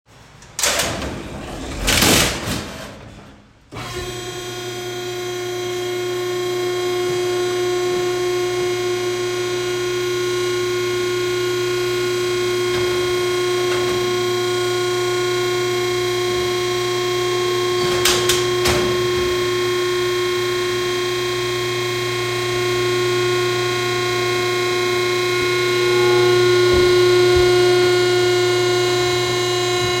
Soundscapes > Other
cardboard baler at work running on a small load, I wish I had of recorded it when I was full of stuff, but maybe next time